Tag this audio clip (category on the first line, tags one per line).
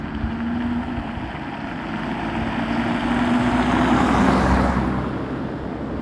Sound effects > Vehicles
asphalt-road
car
moderate-speed
passing-by
studded-tires
wet-road